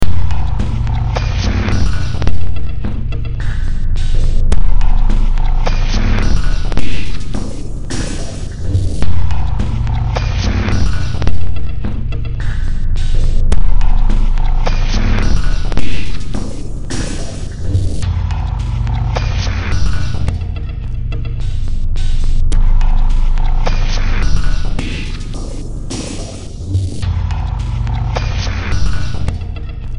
Music > Multiple instruments
Demo Track #3127 (Industraumatic)
Horror, Noise, Industrial, Games, Soundtrack, Sci-fi, Underground, Cyberpunk, Ambient